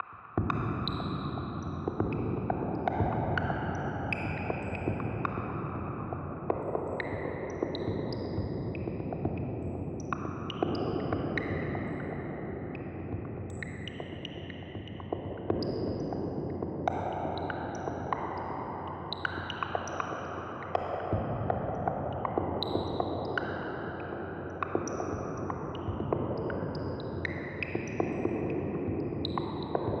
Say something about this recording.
Music > Solo percussion
Perc Loop-Huge Reverb Percussions Loop 3
Ambient, Cave, Cinematic, Loop, Percussion, reverb, Underground